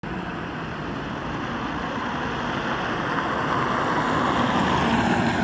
Soundscapes > Urban
Car passing by, recorded with a mobilephone Samsung Galaxy S25, recorded in windy and rainy evening in Tampere suburban area. Wet asphalt with a little gravel on top and car had wintertyres